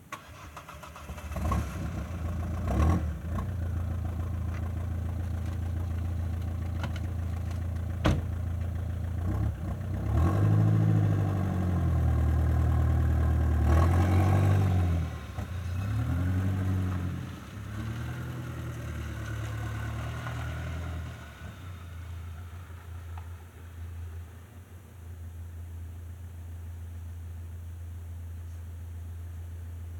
Sound effects > Vehicles
A mostly new Volvo car with a loud exhaust starting, pulling out, idling far away, and then pulling into a reverberant garage and shutting off. All i know is that the engine was severely overheated and has ran away before, so it will be getting swapped. Recorded from my mobile phone, with the Dolby On app.
automobile, car, drive, driving, engine, idle, ignition, motor, rev, revving, shutoff, start